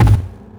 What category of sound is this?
Instrument samples > Synths / Electronic